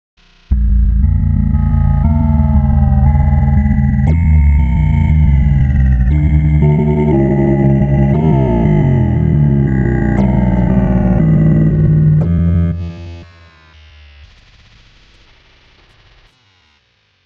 Speech > Processed / Synthetic
wrecked vox 26
effect, sounddesign, animal, alien, abstract, vocal, pitch, reverb, vox, growl, processed, otherworldly, dark, sound-design, weird, fx, monster, spooky, glitchy, howl, wtf, shout, strange, sfx, atmosphere, glitch, vocals